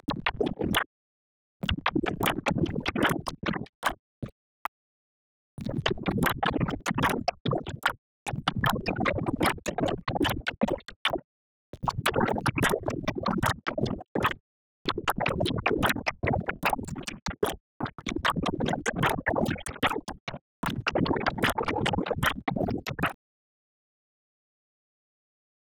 Sound effects > Electronic / Design
FX-Liquid Woosh FX 2
Synthed with phaseplant only.
Water, Woosh